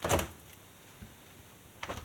Sound effects > Objects / House appliances
Freezer door being opened and closed. Recorded with my phone.
appliance, close, freezer, fridge, kithcen, open, refridgerator